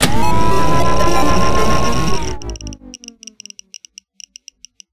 Sound effects > Other mechanisms, engines, machines
A large beam in a laboratory that extracts or scans the DNA of it's target.